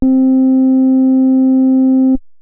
Instrument samples > Synths / Electronic
02. FM-X ALL 1 SKIRT 1 C3root
MODX, Yamaha, Montage, FM-X